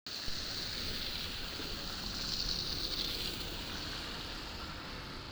Sound effects > Vehicles
tampere bus5
bus passing by near Tampere city center
bus, transportation, vehicle